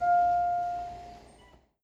Sound effects > Other
BEEP-Samsung Galaxy Smartphone, MCU Elevator, Hotel, Ding Nicholas Judy TDC
A hotel elevator ding. Recorded at the Westin Baltimore-Washington Airport - BWI.